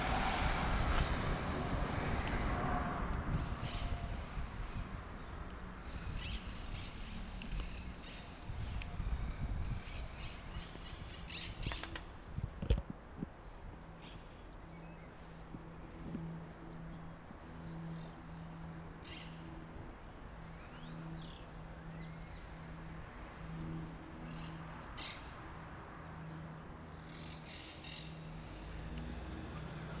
Soundscapes > Urban
20251203 busstop cars people birds annoying stressful
cars, people, stop, bus, annoying, birds, stressful